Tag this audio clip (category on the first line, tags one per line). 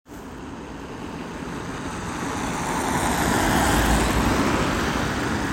Sound effects > Vehicles
car
tampere
field-recording